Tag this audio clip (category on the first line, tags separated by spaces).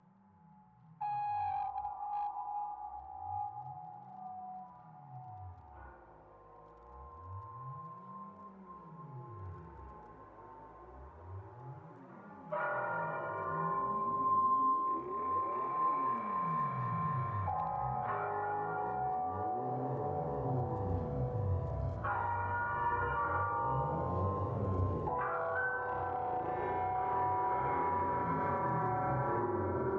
Music > Other
acoustic dark guitar